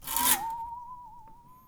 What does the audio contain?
Sound effects > Other mechanisms, engines, machines
Handsaw Pitched Tone Twang Metal Foley 30
fx, hit, perc, household, shop, percussion, vibe, foley, metallic, vibration, saw, twang, plank, tool, twangy, smack, handsaw, sfx, metal